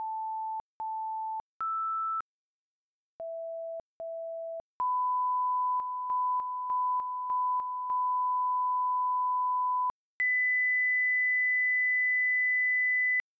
Sound effects > Other
Black & Lane's Ident Tones for Surround (BLITS) L/R: Front LEFT and Front RIGHT – 880 Hz C: CENTRE – 1320 Hz LFE: (Low Frequency Effects) – 82.5 Hz Ls/Rs: Surround LEFT and Surround RIGHT – 660 Hz. EBU Tech 3304-4.1